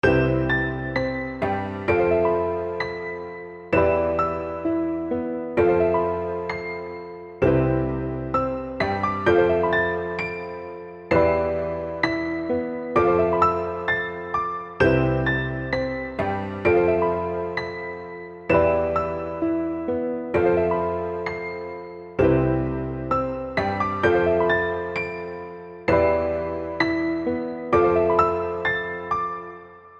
Music > Solo instrument
Ableton Live. VST .Nexus,........melody 130 bpm Free Music Slap House Dance EDM Loop Electro Clap Drums Kick Drum Snare Bass Dance Club Psytrance Drumroll Trance Sample .